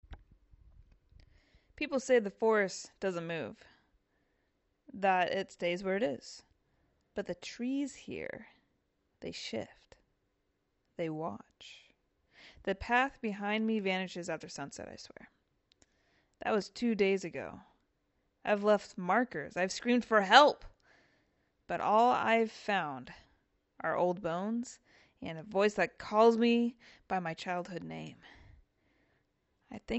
Speech > Solo speech
“The Woods Don’t Let Go” (dark fantasy / folklore / moody monologue)
An eerie, poetic tale of someone trapped in a living forest—great for fantasy horror, mythical sound design, or haunted forest scenes.